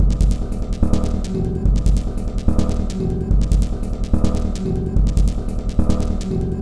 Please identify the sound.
Instrument samples > Percussion
This 145bpm Drum Loop is good for composing Industrial/Electronic/Ambient songs or using as soundtrack to a sci-fi/suspense/horror indie game or short film.
Soundtrack, Drum, Alien, Industrial, Samples, Ambient, Underground, Loop, Dark, Packs, Weird, Loopable